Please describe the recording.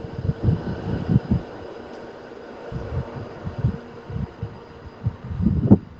Sound effects > Vehicles
tram passing moving away
Tram passing by and continuing further onward at a distance with occasional wind distorting the recording. Recorded approximately 50 meters away from the tram tracks, using the default device microphone of a Samsung Galaxy S20+. TRAM: ForCity Smart Artic X34
urban, tram, transport